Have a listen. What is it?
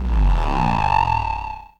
Sound effects > Experimental
Analog Bass, Sweeps, and FX-176
retro, oneshot, basses, sample, sci-fi, electro, weird, fx, sweep, robotic, dark